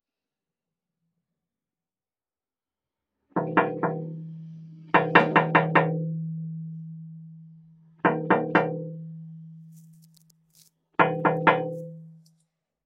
Sound effects > Objects / House appliances
Sound of hitting a glass pane - Som de bater em uma placa de vidro